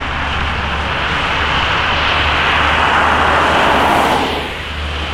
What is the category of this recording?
Sound effects > Vehicles